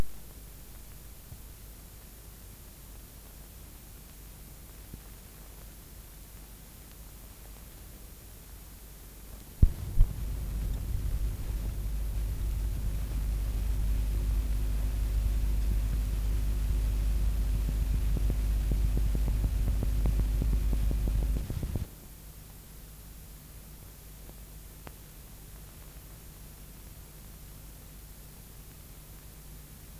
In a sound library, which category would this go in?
Sound effects > Other